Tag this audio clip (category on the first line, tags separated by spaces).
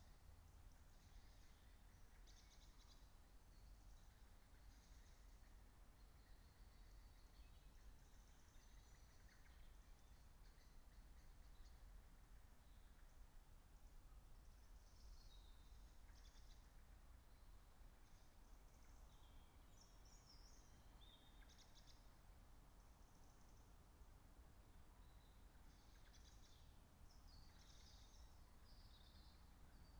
Soundscapes > Nature
field-recording weather-data phenological-recording data-to-sound natural-soundscape raspberry-pi modified-soundscape alice-holt-forest soundscape Dendrophone nature artistic-intervention sound-installation